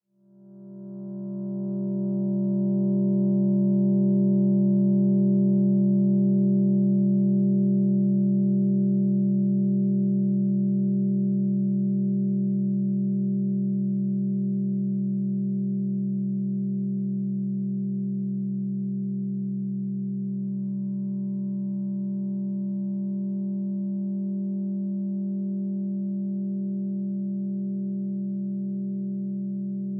Soundscapes > Synthetic / Artificial
Ambient 1 C-G-F Chord
Synthed with the FL studio 'Pluck'' plugin only. No process because I juse recorded it with edison and found that it sounds nice enough.
Pad, Synthwave, Analog, chord, Atomospher, warm, Ambient